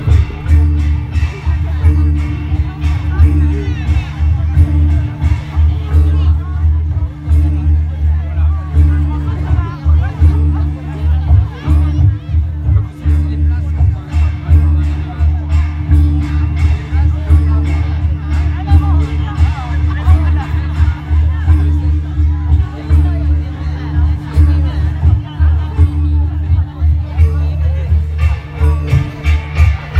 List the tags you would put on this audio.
Soundscapes > Urban
ambient bells chimes Hong Mae metal object ringing Son spiritual temple Thailand

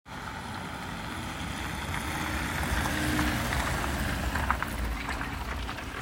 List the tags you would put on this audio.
Sound effects > Vehicles
rain vehicle

